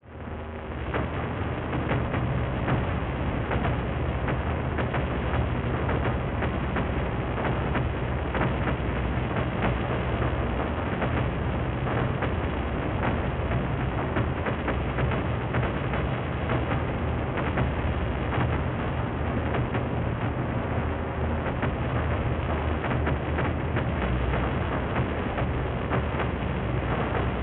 Soundscapes > Urban
IDM Atmosphare4
Synthed with PhasePlant Granular
Noise, Industry, IDM, Ambient, Atomsphare